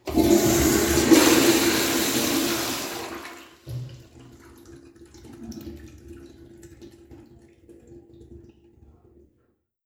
Sound effects > Objects / House appliances
WATRPlmb-Samsung Galaxy Smartphone, CU Toilet Flush, Quiet Air Tank Fill Nicholas Judy TDC
A toilet flushing and air tank filling quietly. Recorded at the Richmond VA Medical Center.